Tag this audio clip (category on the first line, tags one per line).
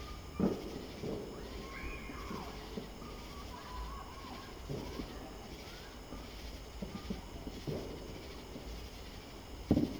Sound effects > Other
america
day
electronic
experimental
explosions
fireworks
fireworks-samples
free-samples
independence
patriotic
sample-packs
samples
sfx
United-States